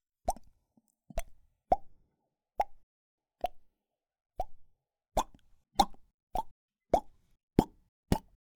Sound effects > Electronic / Design
Pops - Mouth
Various pops made by my own mouth. Extremely useful for accentuating visuals appearing, like word balloons in a cartoon or bullet points on a sheet. Can also be mixed into game show sounds. One of the most versatile sounds I've created, almost video I ever made has used these.
uiux, pop, cartoon, mouth, comic, notification, boing, popping, interface, button, bounce, balloon, ux, ui, bip